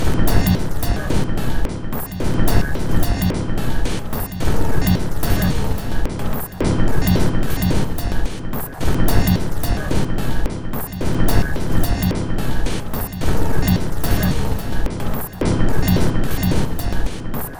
Instrument samples > Percussion
This 109bpm Drum Loop is good for composing Industrial/Electronic/Ambient songs or using as soundtrack to a sci-fi/suspense/horror indie game or short film.
Alien, Ambient, Dark, Drum, Industrial, Loop, Loopable, Packs, Samples, Soundtrack, Underground, Weird